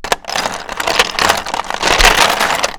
Sound effects > Objects / House appliances
ice cubes movement in tray6
Recorded with rode nt1
cubes, tray, ice